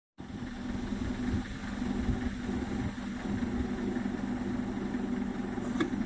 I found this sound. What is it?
Sound effects > Vehicles
final bus 18
finland, bus, hervanta